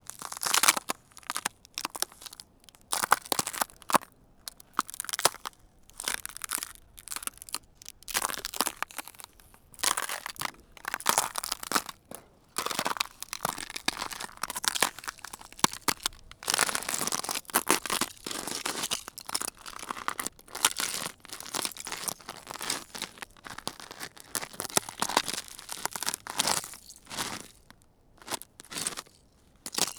Soundscapes > Nature
Steps on ice. ice cracking. ice breaking.
breaking, ice